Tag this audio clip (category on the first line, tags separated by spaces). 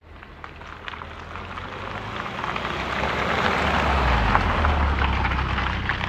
Sound effects > Vehicles
driving combustionengine car